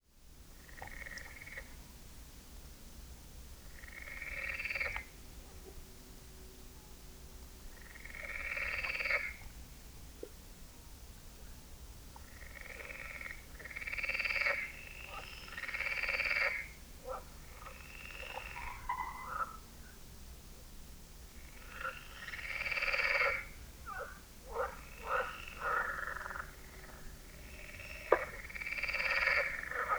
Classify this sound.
Soundscapes > Nature